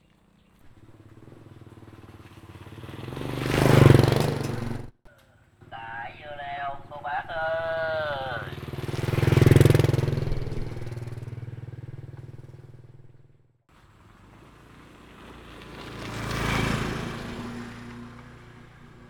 Sound effects > Vehicles
Xe Máy Vĩnh Khánh - Motorcycles 2025.01.16 14:25
Motorcycle trafic. Record use Zoom H4n Pro 2025.01.16 14:25
motor, engine, drive, motocycle